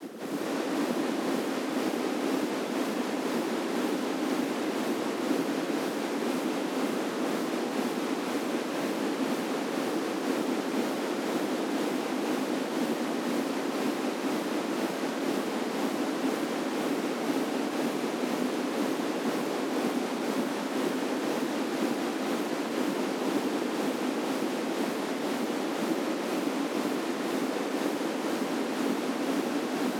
Sound effects > Electronic / Design
Could be used as a space travel sound FX. Created using a Reason 12 synthesizer.
Gaming, travel